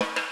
Music > Solo percussion
Snare Processed - Oneshot 200 - 14 by 6.5 inch Brass Ludwig
snaredrum, perc, fx, brass, rim, rimshot, acoustic, drumkit, hits, snare, realdrum, drums, roll, drum, sfx, snares, flam, beat, percussion, rimshots, reverb, kit, realdrums, crack, processed, hit, ludwig, oneshot, snareroll